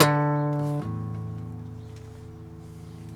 Music > Solo instrument
Acoustic Guitar Oneshot Slice 62
chord, strings, note, oneshot, notes